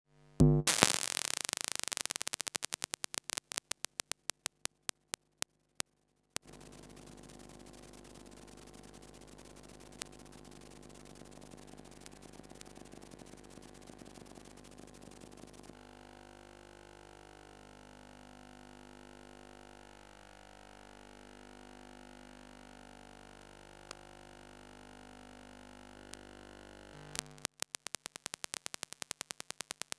Sound effects > Electronic / Design
CRT television set - EMF Recording
The sound the cathode ray tube. Old SONY CRT television set. Gear: - Tascam DR100 Mk3 - Matsushita National RP-963 coil transducer
Television, Electromagnetic